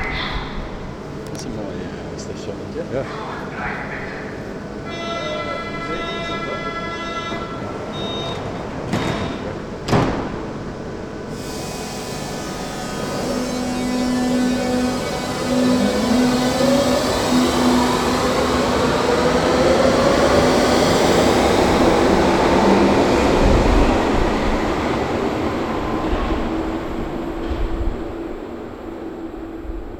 Soundscapes > Urban
Berlin - Metro Drive Away Cool
I recorded this while visiting Berlin in 2022 on a Zoom field recorder.
public-transport, fieldrecorder, germany, berlin, traveling